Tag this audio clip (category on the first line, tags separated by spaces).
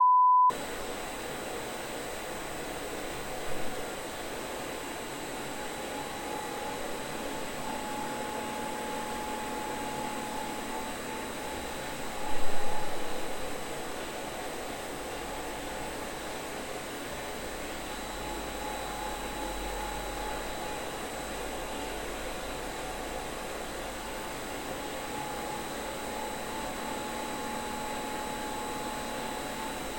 Soundscapes > Indoors
pumping engine Industry factory machinary field-recording pumps background machine station